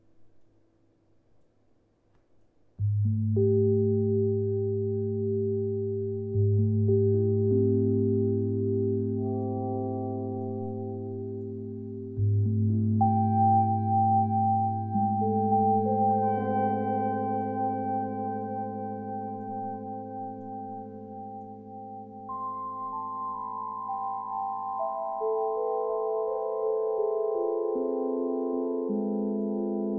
Music > Solo instrument
Soma Terra Ambient #001
This is a recording which I did with the Soma Terra. Recorder: Tascam Portacapture x6.
ambiance, ambience, ambient, atmosphere, background, background-sound, recording, Relaxing, soma, soundscape, terra